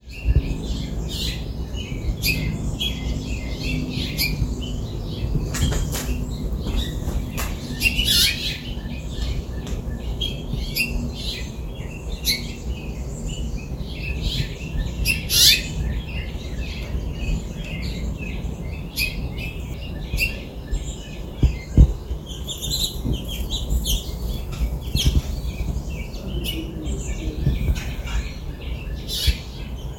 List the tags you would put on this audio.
Soundscapes > Nature
other call house-finch field-recording birds Phone-recording

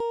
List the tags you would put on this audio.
Instrument samples > String
arpeggio tone sound cheap guitar stratocaster design

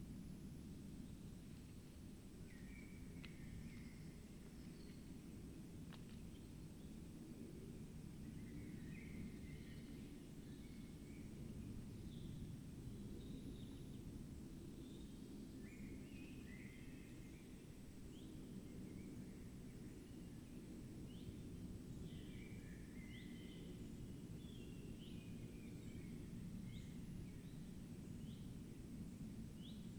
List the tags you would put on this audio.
Soundscapes > Nature
Dendrophone natural-soundscape field-recording

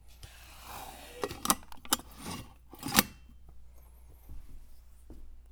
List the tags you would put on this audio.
Sound effects > Objects / House appliances
bonk drill fieldrecording foley foundobject fx hit mechanical metal natural oneshot perc percussion sfx

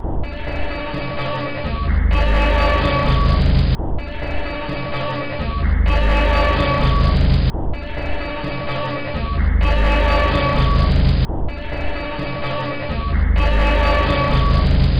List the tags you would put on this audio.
Instrument samples > Percussion
Dark Samples Loopable Weird

